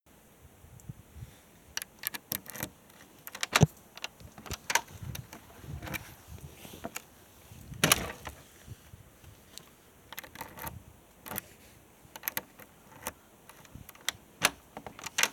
Sound effects > Objects / House appliances
Unlocking and slamming shut a front door. Recorded with my phone.